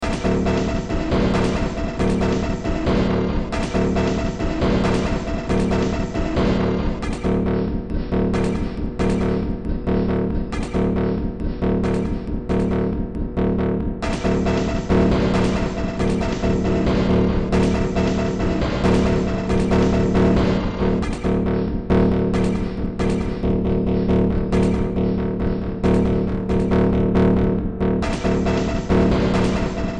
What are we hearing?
Music > Multiple instruments
Short Track #3442 (Industraumatic)
Industrial, Sci-fi, Cyberpunk, Noise, Ambient, Underground, Soundtrack, Horror, Games